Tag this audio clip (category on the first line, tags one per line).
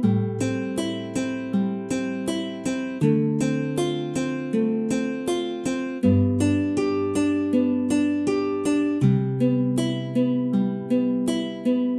Music > Solo instrument
80bpm
acoustic
Chord
ChordPlayer
guitar
happy
mellow
music
OneMotion
Progression
relaxing